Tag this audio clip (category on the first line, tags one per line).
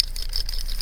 Sound effects > Objects / House appliances
cap
delicate
foley
metal
sfx
small
tap
taps
tink